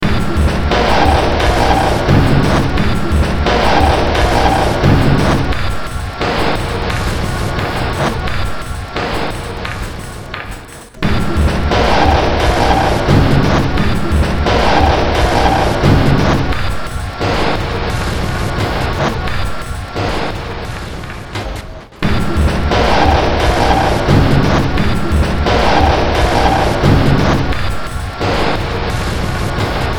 Music > Multiple instruments
Short Track #3742 (Industraumatic)
Ambient, Cyberpunk, Games, Horror, Industrial, Underground